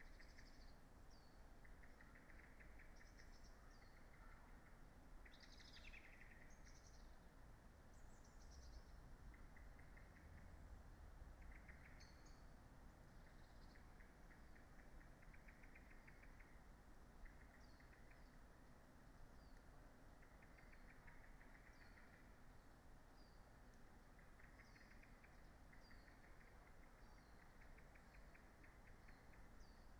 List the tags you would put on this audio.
Soundscapes > Nature
nature
field-recording
phenological-recording
sound-installation

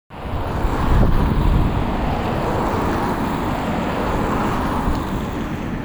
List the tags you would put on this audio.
Sound effects > Vehicles
car
traffic

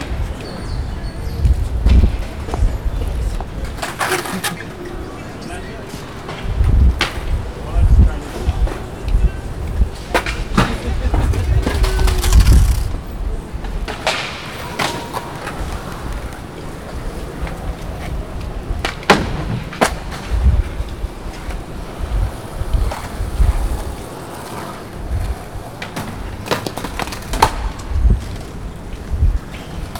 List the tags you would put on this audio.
Soundscapes > Urban
skating
park
field
walk
recording
street

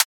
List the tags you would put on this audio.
Instrument samples > Synths / Electronic
surge
synthetic
electronic
fm

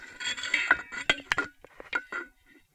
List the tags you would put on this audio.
Sound effects > Experimental
contact-mic
experimental
thermos
water-bottle
water
contact-microphone

